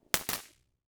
Sound effects > Other
status paralyze
44 - Applying the "Paralyzed" Status Foleyed with a H6 Zoom Recorder, edited in ProTools
effect, paralyze, status